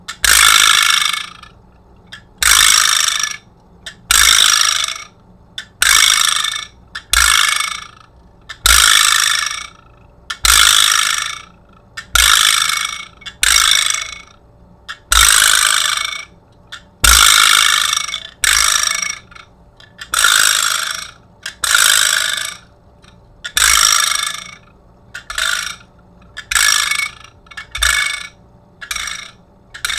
Music > Solo percussion
TOONTwang-Blue Snowball Microphone, CU Vibraslap, Rattle Nicholas Judy TDC
Vibraslap twangs and rattles.
Blue-brand, twang, vibraslap